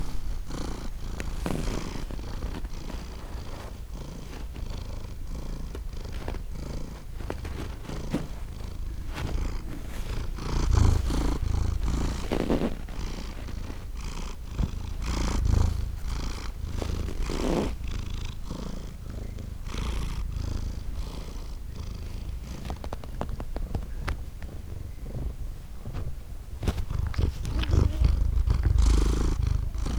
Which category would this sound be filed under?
Sound effects > Animals